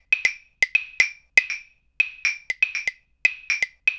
Music > Solo percussion
Three Claves-6
eight loops made from samples of three claves in interesting polyrhythms. Can be used alone or in any combination (they all should sound fine looped together in virtually any order)